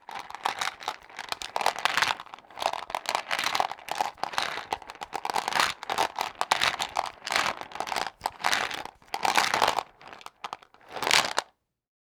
Sound effects > Objects / House appliances
Pills rattling
Stereo recording of pills rolling around in container. Moved by hand
container, rattling, bottle, pills